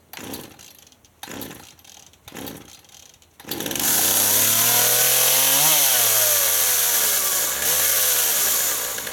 Sound effects > Other mechanisms, engines, machines
Partner 351 chainsaw starts and revs. Recorded with my phone.